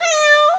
Sound effects > Animals
Cat Meowing
A cat meow sound.
adorable, animal, annoyed, cat, cat-call, cat-communication, cat-speak, cat-speaking, cat-talking, classic-cat, creature, cute, cute-cat, feline, kitten, kitty, pet, sweet, the-sound-cats-make, upset